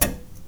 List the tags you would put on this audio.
Sound effects > Other mechanisms, engines, machines
metal
saw
twangy
perc
foley
metallic
plank
vibe
household
percussion
vibration
tool
twang
hit
handsaw
smack
shop
sfx
fx

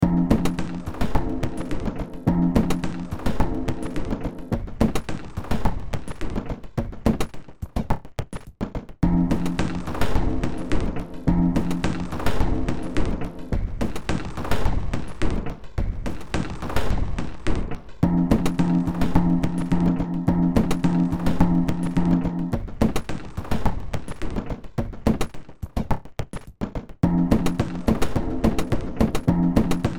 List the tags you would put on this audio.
Music > Multiple instruments
Ambient
Cyberpunk
Games
Horror
Noise
Sci-fi
Soundtrack
Underground

